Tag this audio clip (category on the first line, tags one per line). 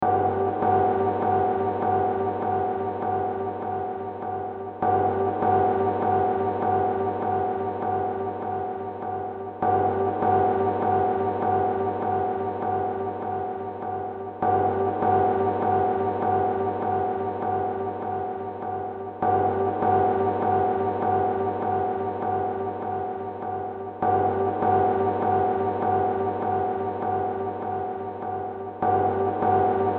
Soundscapes > Synthetic / Artificial
Ambience
Ambient
Darkness
Drone
Games
Gothic
Hill
Horror
Noise
Silent
Soundtrack
Survival
Underground
Weird